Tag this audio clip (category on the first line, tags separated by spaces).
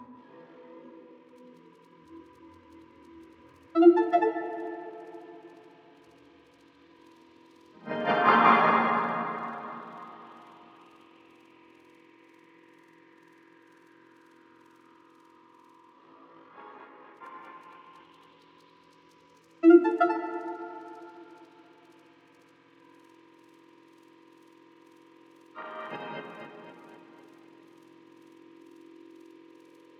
Instrument samples > Synths / Electronic
loop minimal glitch sound-design sound electronic